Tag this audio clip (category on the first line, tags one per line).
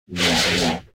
Sound effects > Other
combination
dark
fire
spell